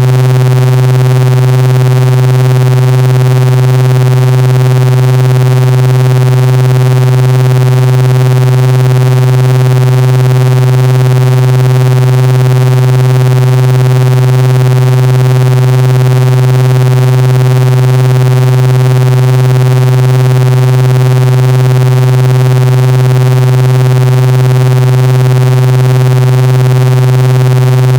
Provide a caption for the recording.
Soundscapes > Synthetic / Artificial
Space Drone 005
Drone sound 005 Developed using Digitakt 2 and FM synthesis
artificial, drone, FM, soundscape, space